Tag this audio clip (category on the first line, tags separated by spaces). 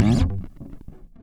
Music > Solo instrument
electric rock riff harmonic slide lowend electricbass fuzz funk note bassline harmonics chords chuny low pick bass pluck slides notes slap blues basslines riffs